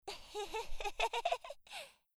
Sound effects > Human sounds and actions
It's a fxs created for the game Dungeons and Bubbles for The Global Game Jam 2025.